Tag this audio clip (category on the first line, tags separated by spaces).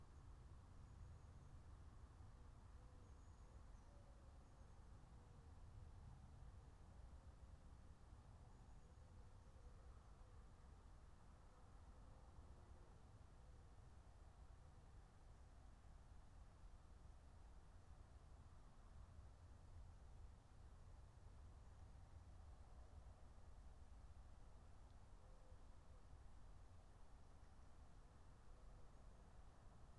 Soundscapes > Nature
raspberry-pi alice-holt-forest field-recording phenological-recording natural-soundscape soundscape meadow nature